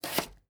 Sound effects > Other
Quick vegetable chop 16
Vegetable, Quick, Cooking, Chief, Kitchen, Home, Chef